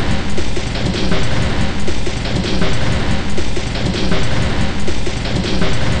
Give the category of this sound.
Instrument samples > Percussion